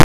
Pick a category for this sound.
Sound effects > Experimental